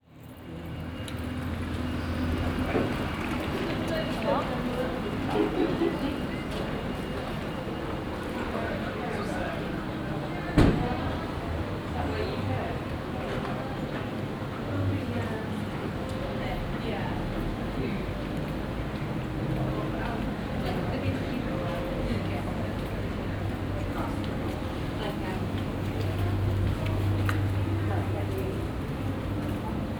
Soundscapes > Urban
Cardiff - Womanby St 01
cardiff, citycentre, fieldrecording